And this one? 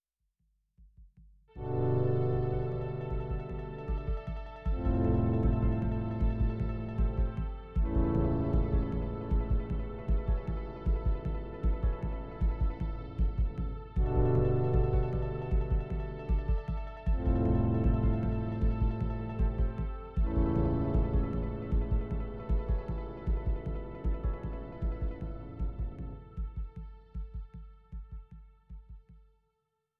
Music > Multiple instruments
Three chords with that repeat twice. Thoughtful, emotionally neutral, and holding tension.